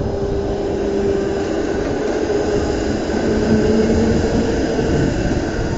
Soundscapes > Urban
Passing Tram 23
field-recording, urban